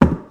Sound effects > Objects / House appliances
A bucket being hit in vatious ways recorded by a usb mic trimmed short for possible imapact layering/ foley uses and raw. Visit my links for more Sound packs.